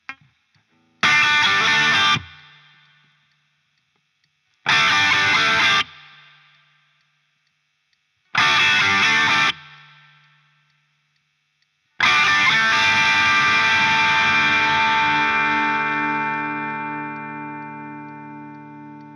Music > Solo instrument
Early Acdc like sound
The intention is to play the right strings with power to get that kind of effect. These are the true sound of a rocker, not machines. Crafted with a real Fender guitar and AmpliTube 5, you're getting genuine, unadulterated guitar energy. Request anything, available to tour or record anywhere!
heavy, rock, guitar